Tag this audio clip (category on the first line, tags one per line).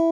Instrument samples > String
guitar
design
sound
cheap
tone
arpeggio
stratocaster